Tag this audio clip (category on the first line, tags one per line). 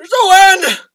Speech > Solo speech
war
army
attack
Hopeless
agression